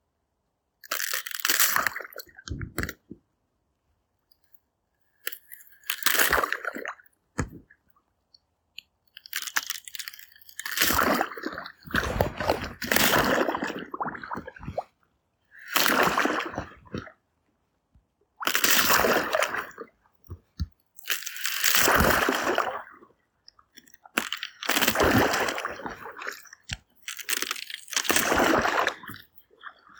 Soundscapes > Nature
Breaking through ice into water.
Field-recording done by me repeatedly breaking through the ice in a shallow pond with my boots. Edited to remove the wind.
breaking, breaking-ice, field-recording, ice, water